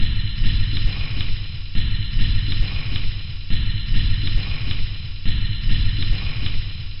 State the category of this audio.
Instrument samples > Percussion